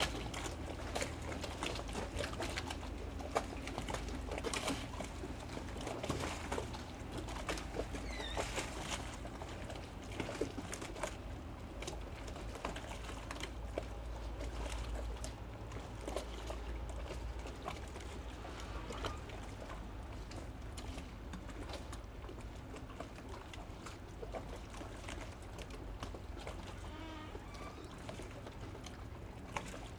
Soundscapes > Urban
Venise small Boat Lapping water
Small waves create splashes against gondolas and some creaks / squeaks, on a shore of Venice near San Marco's Square, Venice, Venice, winter 2025 in the night. The evergoing shuttles make some low rumble in the background. AB omni stereo, recorded with 2 x EM272 Micbooster microphones & Tascam FR-AV2
lap, field-recording, close-range, venice, venezia, shore, venise, sea, boat, lapping, waves, splash, gondola, water